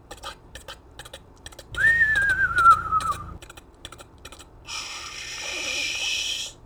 Sound effects > Human sounds and actions
TOONAnml-Blue Snowball Microphone, CU Horse, Gallop, Whinny, Screech to Stop, Human Imitation Nicholas Judy TDC
A horse galloping, whinnying and screeching to a stop. Human imitation.
Blue-brand, Blue-Snowball, gallop, horse, human, imitation, screech, stop, whinny